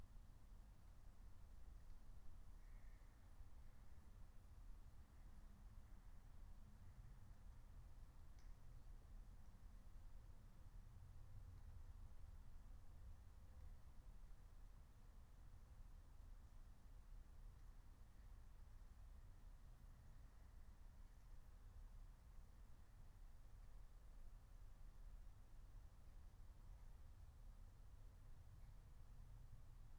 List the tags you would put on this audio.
Soundscapes > Nature
natural-soundscape field-recording raspberry-pi nature meadow soundscape alice-holt-forest phenological-recording

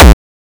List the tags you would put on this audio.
Instrument samples > Percussion
Distorted Kick

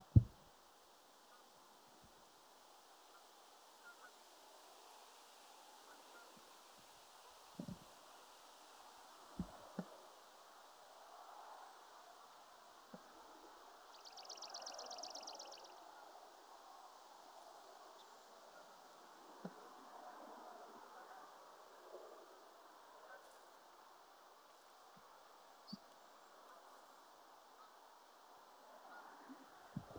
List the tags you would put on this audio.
Soundscapes > Nature
bird birds birdsong field-recording nature

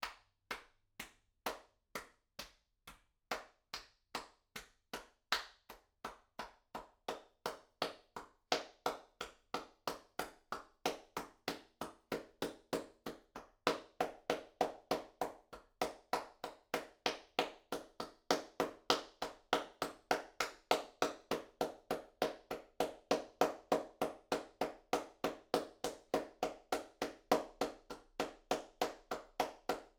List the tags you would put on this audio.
Sound effects > Human sounds and actions
Applaud Applauding Applause AV2 clap clapping FR-AV2 individual indoor NT5 person Rode solo Solo-crowd Tascam XY

